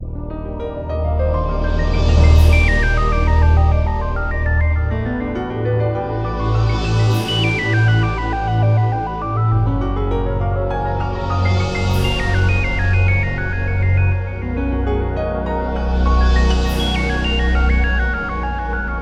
Music > Multiple instruments
Elysian Depths (Music Loop) 3
Dylan-Kelk, gleaming-ocean, glittering-ocean, glorious-sea, Lux-Aeterna-Audio, ocean-documentary-music, ocean-music, ocean-theme, soothing-loop, vast-ocean, water-level, water-level-theme